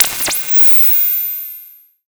Sound effects > Electronic / Design
SFX Digitalsparkflare CS Reface-01.
Spark-style FX flare, good for electronic drops or stingers.